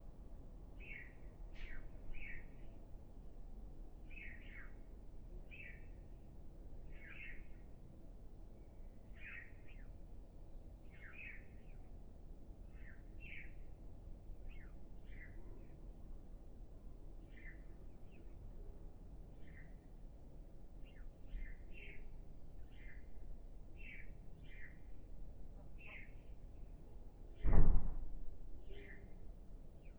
Sound effects > Experimental
Sparrows played at 75% speed sound very similar to parakeets. (some noise reduction was applied, but no other effects changing speed to 75%)